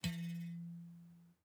Sound effects > Other mechanisms, engines, machines
Small Boing 03
garage, noise, sample, boing